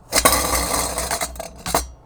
Sound effects > Objects / House appliances
TOYMech-Blue Snowball Microphone, CU Top, Spin to Stop, Short Nicholas Judy TDC
Short spinning top spins to a stop.
spin, Blue-Snowball, foley, Blue-brand, short, spinning-top, stop